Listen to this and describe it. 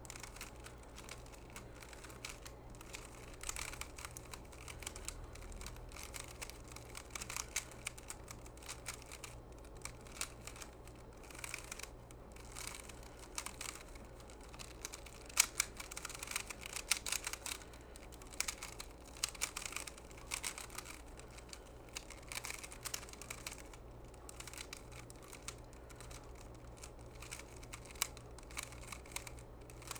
Sound effects > Objects / House appliances
TOYMisc-Blue Snowball Microphone, MCU Rubik's Cube, Playing Nicholas Judy TDC
Playing with a rubik's cube.
Blue-brand, Blue-Snowball, foley, play, rubiks-cube, toy